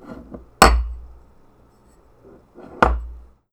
Sound effects > Objects / House appliances
FOODGware-Blue Snowball Microphone, CU Pint Glass, Knock Over, No Liquid Spill, Pick Up, Bar Nicholas Judy TDC
A pint bar glass knocking over without liquid spilling and picking up.
Blue-brand, Blue-Snowball, knock-over, pint, bar, pick-up, foley, glass